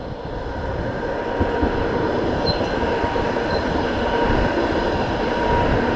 Sound effects > Vehicles
Tram 2025-11-02 klo 13.27.56
Sound recording of a tram passing by. Recording done in Hallilan-raitti, Hervanta, Finland near the tram line. Sound recorded with OnePlus 13 phone. Sound was recorded to be used as data for a binary sound classifier (classifying between a tram and a car).